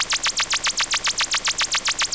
Sound effects > Electronic / Design
weird sound
kinda sounds like a laser
laser, sound, weird